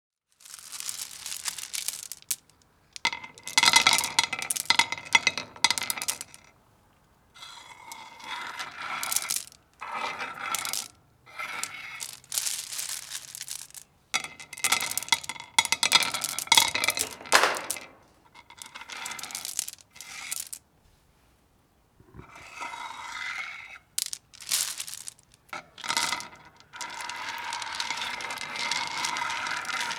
Sound effects > Natural elements and explosions
ROCKMvmt Handling Smooth Pebbles
Running my hands through a bed of smooth garen pebbles. Picking them up and dropping them onto themselves as well as a cement paver then scraping them off the paver.
falling, pebbles, scrape, cement, stone